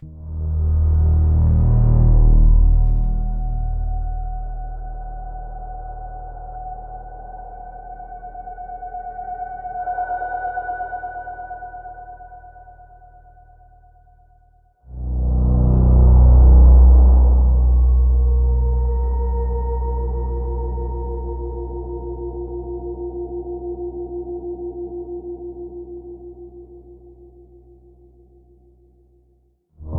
Music > Multiple instruments
This is part of some experiments I am running to create atonal atmospheric soundscape using AI AI Software: Suno Prompt: atonal, atmosferic, background, texture, pad synths, sad mood, minor scale